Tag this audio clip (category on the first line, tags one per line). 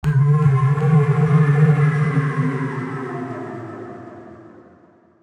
Sound effects > Electronic / Design
Noise
Sci-fi
SFX
Trippy
Synth
Bass
Optical
Electronic
Experimental
FX
Sweep
Infiltrator
Spacey
Handmadeelectronic
noisey
Robotic
Theremin
Robot
Analog
Digital
Dub
Otherworldly
Glitchy
Alien
DIY
Glitch
Scifi
Theremins
Instrument
Electro